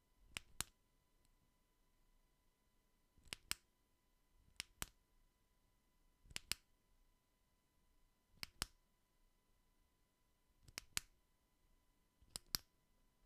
Sound effects > Objects / House appliances
Flashlight Switch (On/Off)
Sounds of the switch on a Maglite being turned on and off Recorded with a cheap USB microphone, so there's noise and interference in the background
button, click, clicking, flashlight, press, switch